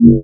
Instrument samples > Synths / Electronic
DISINTEGRATE 4 Bb
bass, fm-synthesis